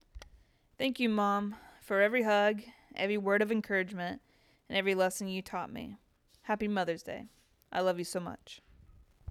Speech > Solo speech
Mother's Day Thank You – Calm and Reassuring
A calm and loving Mother's Day message, full of gratitude and emotion. Great for sentimental videos, tributes, or personal notes. Script: "Thank you, Mom. For every hug, every word of encouragement, and every lesson you taught me. Happy Mother’s Day. I love you so much."
CelebrateMom, HeartfeltMessage, MothersDay, ThankYouMom, VoiceOver